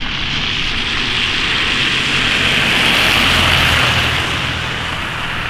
Sound effects > Vehicles
Car00087192CarSinglePassing
Sound recording of a single car driving down a road. The recording was made on a rainy, winter day. The segment of the road the recording was made at was in an urban environment without crosswalks or streetlights. Recorded at Tampere, Hervanta. The recording was done using the Rode VideoMic.
automobile, rainy, drive, car, field-recording, vehicle